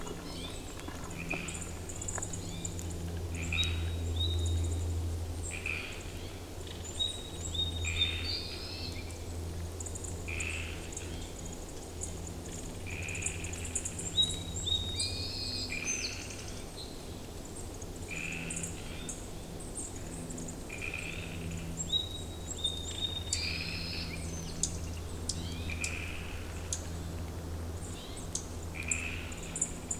Soundscapes > Nature
Pender island birds in a forest near a dam and lake
Birds recorded in Pender island next to a lake